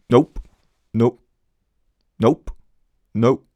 Speech > Solo speech
Displeasure - No (Multi-take)
Video-game, displeasure, Multi-take, Mid-20s, dialogue, Human, To-Be-Edited, Chop-Me, Man, NPC, voice, Vocal, Word, talk, Tascam, nope, displeasured, Voice-acting, refusal, FR-AV2, U67, Male, no, Neumann